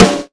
Instrument samples > Percussion
snare blend of 6×13 DW Edge and A&F Drum Co. 5.5x14 Steam Bent - trigger widenoise 1
Too extended noise duration to be good. tags: trigger, triggersnare. trigger-snare
atheosnare, blendsnare, deathdoom, death-metal, doomdeath, drum, drums, DW, Godsnare, Ludwig, mainsnare, metal, mixed-snare, percussion, percussive, pop, snare, snareblend, snared, The-Godsnare, trigger, trigger-snare, triggersnare